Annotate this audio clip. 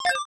Sound effects > Electronic / Design
ANALOG POWERFUL ELECTRIC BLEEP
BOOP, ELECTRONIC, HIT, SYNTHETIC, INNOVATIVE, CIRCUIT, COMPUTER, UNIQUE, CHIPPY, BEEP, DING, OBSCURE, SHARP, HARSH